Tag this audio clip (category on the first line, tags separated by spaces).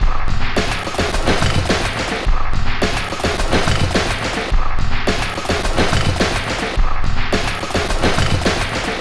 Instrument samples > Percussion
Samples Alien Soundtrack